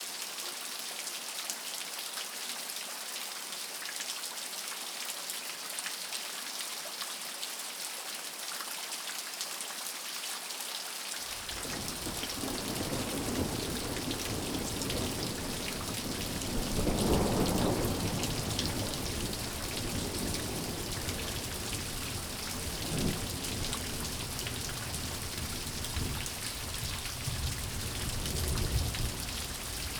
Soundscapes > Nature
Rain & Thunder on Back Porch - Edited
EDITED: Increased stereo width of original recording.
backporch, field-recording, nature, rain, storm, thunder, thunderstorm, weather